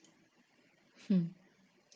Sound effects > Objects / House appliances
es un hmmm de relax